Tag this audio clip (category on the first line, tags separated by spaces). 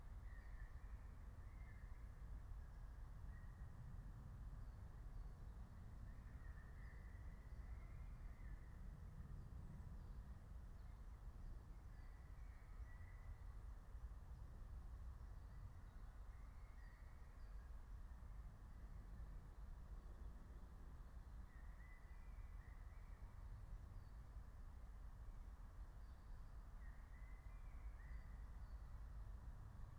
Soundscapes > Nature
alice-holt-forest field-recording natural-soundscape nature phenological-recording soundscape